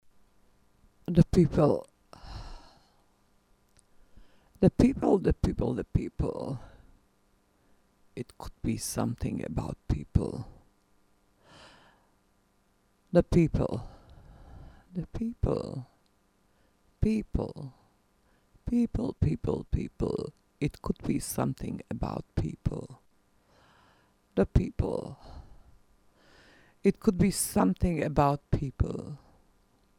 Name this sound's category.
Sound effects > Electronic / Design